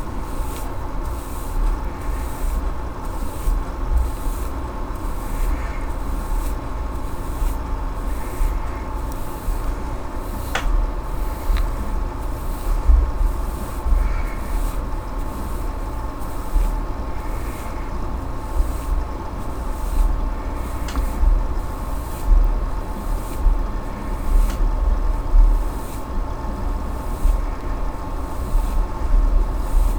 Sound effects > Objects / House appliances
FOLYMisc-Blue Snowball Microphone, CU Hair, Brush Nicholas Judy TDC
hair, Blue-Snowball, Blue-brand, foley, brush